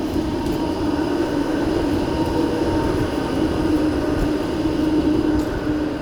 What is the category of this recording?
Sound effects > Vehicles